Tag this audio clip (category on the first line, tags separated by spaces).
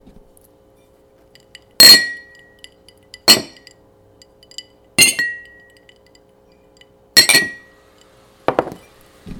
Sound effects > Objects / House appliances
cheers clink glass glasses toast